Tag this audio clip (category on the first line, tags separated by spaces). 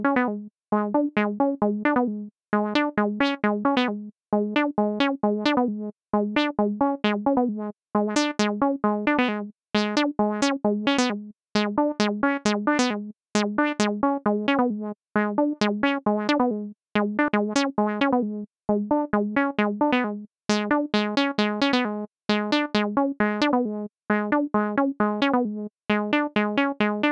Music > Solo instrument
303 Acid electronic hardware house Recording Roland synth TB-03 techno